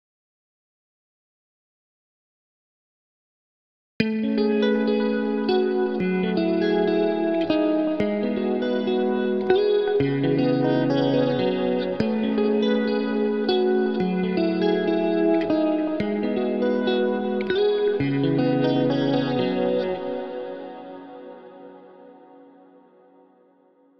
Music > Solo instrument
I made these with my electric guitar! Even for business purposes or for your own music!